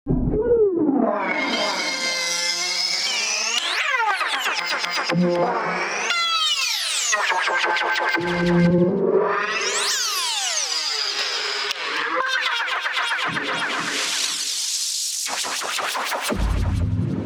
Speech > Processed / Synthetic
wrecked vox 21
abstract, alien, animal, atmosphere, dark, effect, fx, glitch, glitchy, growl, howl, monster, otherworldly, pitch, processed, reverb, sfx, shout, sound-design, sounddesign, spooky, strange, vocal, vocals, vox, weird, wtf